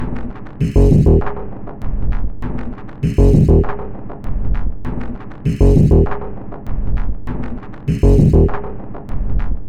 Instrument samples > Percussion
This 198bpm Drum Loop is good for composing Industrial/Electronic/Ambient songs or using as soundtrack to a sci-fi/suspense/horror indie game or short film.
Soundtrack, Drum, Samples, Packs, Ambient, Dark, Loop, Industrial, Loopable, Weird, Underground